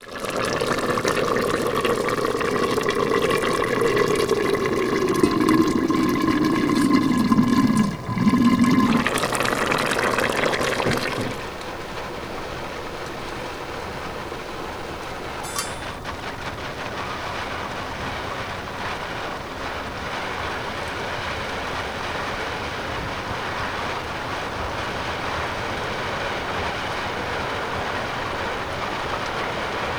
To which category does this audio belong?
Sound effects > Objects / House appliances